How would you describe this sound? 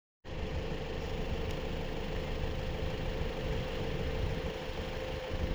Sound effects > Other mechanisms, engines, machines
clip auto (19)
Description (Car) "Car Idling: whirring fans, moving pistons, steady but faint exhaust hum. Close-range audio captured from multiple perspectives (front, back, sides) to ensure clarity. Recorded with a OnePlus Nord 3 in a residential driveway in Klaukkala. The car recorded was a Toyota Avensis 2010."